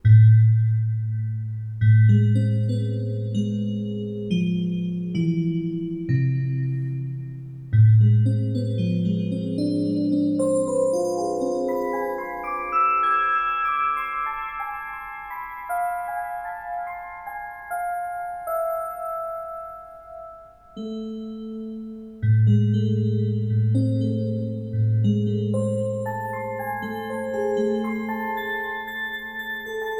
Music > Solo instrument
Soma Terra Ambient #002
This is a recording which I did with the amazing Soma Terra. It was early in the morning in Villach (Austria), where I work. Outside was cold and there was a lot of silence. I had sit on the floor and let myself be transported by the Soma Terra. Recorder: Tascam Portacapture x6. Post editing: none.